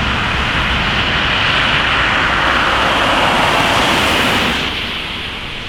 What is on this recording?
Sound effects > Vehicles
Car00059355CarMultiplePassing
Sound recording of cars driving on a road, with quick succession of multiple cars being heard one after the other. The recording was made on a rainy, winter day. The segment of the road the recording was made at was in an urban environment without crosswalks or streetlights. Recorded at Tampere, Hervanta. The recording was done using the Rode VideoMic.